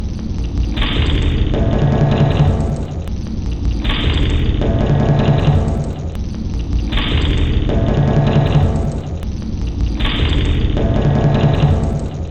Instrument samples > Percussion
This 156bpm Drum Loop is good for composing Industrial/Electronic/Ambient songs or using as soundtrack to a sci-fi/suspense/horror indie game or short film.
Drum, Industrial, Alien, Ambient, Underground, Loopable, Dark, Weird, Soundtrack, Packs, Samples, Loop